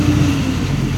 Sound effects > Vehicles
MAN/Solaris bus' automatic transmission shifting gears.